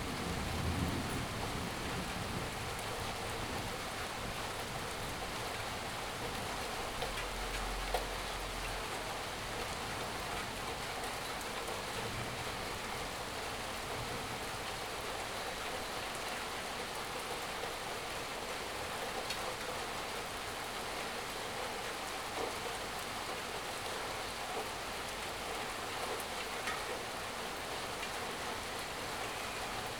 Soundscapes > Urban
Thunderstorm in the city
A thunderstorm from my terrace, with the sound of rain falling on the shed.
field-recording, lightning, rain, rainstorm, storm, thunder, thunderstorm, weather